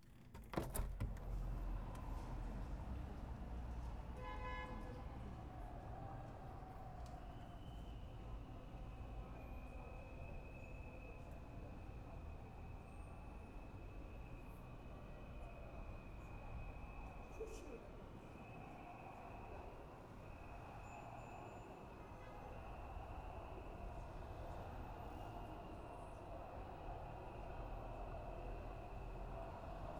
Soundscapes > Urban
A mono recording of a late-evening city protest in the Vake district of Tbilisi on 15_05_24. Features chanting crowds, calls of “Sakartvelo,” car horns, distant marching groups, and occasional children’s voices. A vivid and authentic urban protest atmosphere. If you’d like to support my work, you can get all my ambience recordings in one pack on a pay-what-you-want basis (starting from just $1). Your support helps me continue creating both free and commercial sound libraries! 🔹 What’s included?